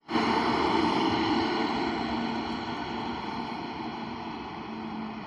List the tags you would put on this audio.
Sound effects > Vehicles
drive
tram
vehicle

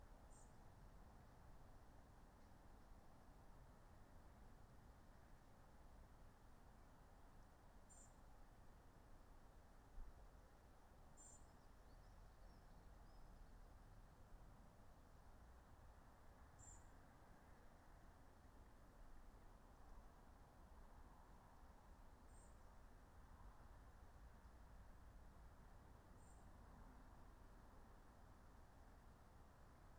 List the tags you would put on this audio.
Soundscapes > Nature
alice-holt-forest
data-to-sound
soundscape
field-recording
weather-data
nature
phenological-recording
raspberry-pi
artistic-intervention
sound-installation
natural-soundscape
Dendrophone
modified-soundscape

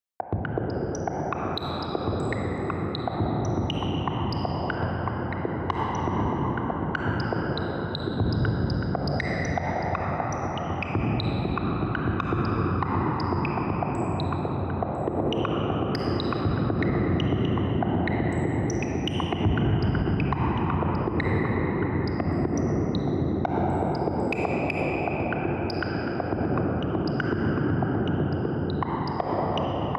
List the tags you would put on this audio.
Music > Solo percussion
Percussion
Loop
Cave
Underground
Ambient
Cinematic
reverb

